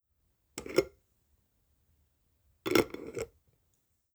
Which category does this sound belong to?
Sound effects > Objects / House appliances